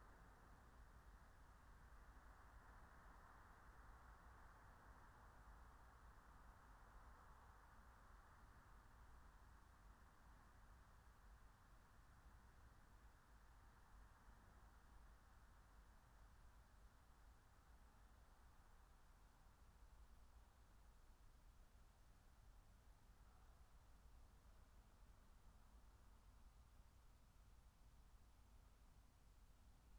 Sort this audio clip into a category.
Soundscapes > Nature